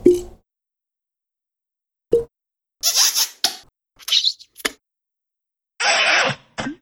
Sound effects > Objects / House appliances
FOODGware Champagne Cork Pops, X5 Nicholas Judy TDC
Champagne cork pops, some three comical ones. Nickname: 'The Wine Tester's Convention'.